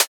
Instrument samples > Synths / Electronic
A hi-hat one-shot made in Surge XT, using FM synthesis.
surge, synthetic, electronic, fm